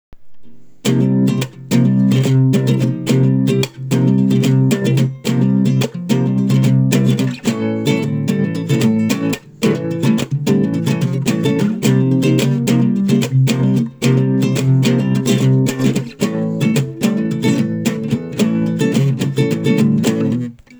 Music > Solo instrument
nylon guitar loop sequence
simple chords strumming on nylon guitar
acoustic, chord, guitar, nylon, sequence